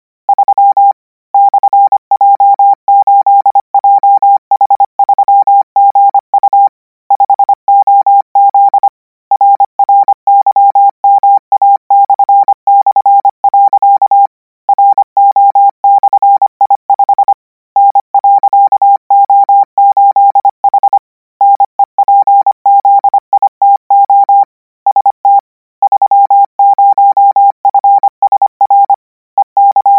Sound effects > Electronic / Design
Koch 29 KMRSUAPTLOWI.NJEF0YVGS/Q9ZH38 - 780 N 25WPM 800Hz 90%
Practice hear characters 'KMRSUAPTLOWI.NJEF0YVGS/Q9ZH38' use Koch method (after can hear charaters correct 90%, add 1 new character), 780 word random length, 25 word/minute, 800 Hz, 90% volume. Code: 3 /j8jh3gu 5oz rryma//. ro/i5 n.o8h nepzito st 30fsr ek 0j oln50. z0zqnl /8h5 9w g.93agev 08i legasl8fl rk8epe0 p/fh3 .ohkkmrof j ja8qtme30 ah uvnm0. pfjwpu9ku nv ae3mw z8yhv o.ff9ve zzrkgrzl lng s5hs zn8 rlgyzems y8ni f vrtze 8tgso fo9i0eka/ la.8yqih/ 5mwt9 p afqn0w9 0 s wsl/ yl3lr ksqtvuah n jme 8s3qp ypqn0rszu z8w wpktt .nt3q3 55k/s fley.se8 jmt 8 fpj8 nyp. 3zu.8r8 wygnuvh .uviutow g90ht 0suk 3ksk qppasl0 88.3ljz onyfap. ptk3w5lu3 omeymp0au vklkph isg r3h ky/u0 ay qigsff.t0 8qwt pgrlw8 iyjepjnfg 8ustgej 8nqwn l e jelz euqk0wg m9 ol3ely s8aft qeem .hr .
characters, code, codigo, morse, radio